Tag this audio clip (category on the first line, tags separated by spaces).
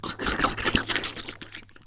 Sound effects > Experimental
Monster bite demon weird fx Sfx zombie gross devil snarl Alien dripping Creature grotesque mouth growl howl otherworldly